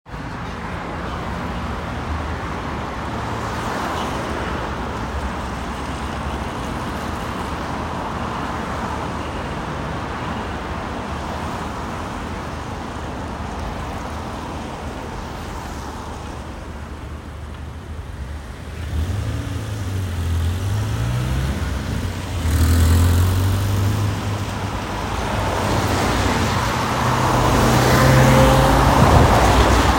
Soundscapes > Urban
City street noise after rain. Rush hour
Afternoon Saint-Petersburg traffic after rain at June 2025